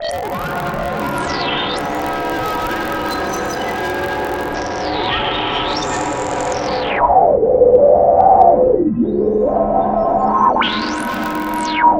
Sound effects > Electronic / Design
Roil Down The Drain 15
cinematic, sci-fi, mystery, drowning, horror, content-creator, dark-soundscapes, sound-design